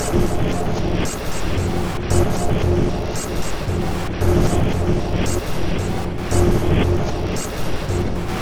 Instrument samples > Percussion
This 228bpm Drum Loop is good for composing Industrial/Electronic/Ambient songs or using as soundtrack to a sci-fi/suspense/horror indie game or short film.
Alien, Ambient, Dark, Drum, Industrial, Loop, Loopable, Packs, Samples, Soundtrack, Underground, Weird